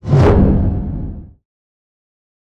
Sound effects > Other
Sound Design Elements Whoosh SFX 011
film, sweeping, trailer, audio, whoosh, ambient, fast, motion, production, fx, movement, elements, cinematic, swoosh, effects, transition, sound, dynamic, effect, element, design